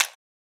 Sound effects > Objects / House appliances
Matchsticks ShakeBox 7 Clap
Shaking a matchstick box, recorded with an AKG C414 XLII microphone.
matchstick-box, shaker, matchstick, matches